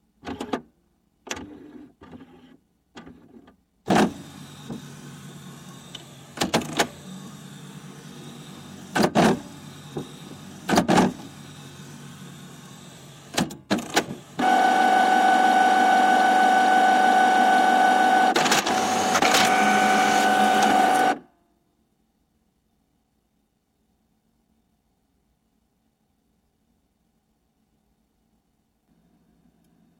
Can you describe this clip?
Sound effects > Objects / House appliances
Recording of the complete cycle of a Canon Pixma TS3720 all-in-one printer. To get this recording, the microphones were placed inside the edge of the printer. Then we set the printer off. Do not attempt this yourself without an expert assisting you, as I had a printers expert with me when setting this up.